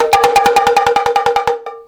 Instrument samples > Percussion
The instrument was a toy-like small sized. Sound of every adjacent beat comes from the opposite side, as the hammering bead hits the drum head alternatively.